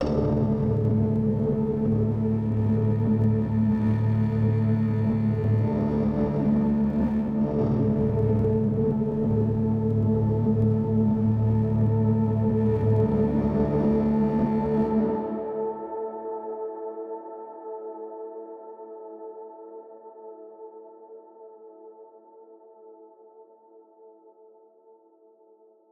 Instrument samples > Synths / Electronic
Multi-layered drone sound using some strings, samples, and effects.
ambient, atmosphere, atmospheric, design, designed, distort, drone, effect, layer, layered, pad, soundscape, space, string